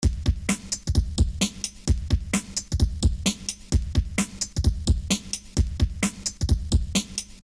Music > Solo percussion
Ableton Live. VST......Fury-800......Drums 130 Bpm Free Music Slap House Dance EDM Loop Electro Clap Drums Kick Drum Snare Bass Dance Club Psytrance Drumroll Trance Sample .